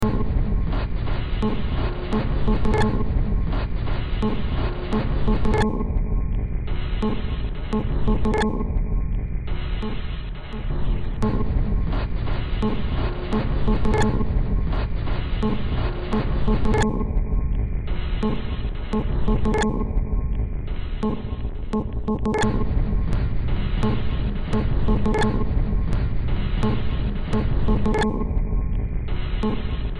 Music > Multiple instruments
Short Track #3376 (Industraumatic)
Ambient, Cyberpunk, Horror, Industrial, Sci-fi, Soundtrack